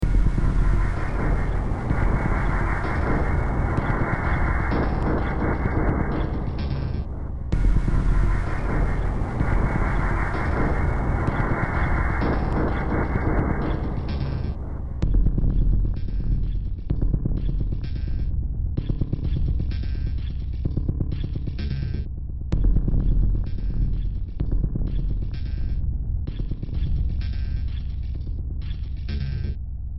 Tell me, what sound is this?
Music > Multiple instruments
Demo Track #3995 (Industraumatic)
Soundtrack, Ambient, Noise, Underground, Sci-fi, Cyberpunk, Games, Horror, Industrial